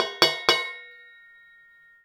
Music > Solo instrument
Cym Side Hits and Grab Stop-001
Sabian, Metal, Drum, Percussion, Crash, Perc, Ride, Cymbal, FX, Paiste, Custom, GONG, Kit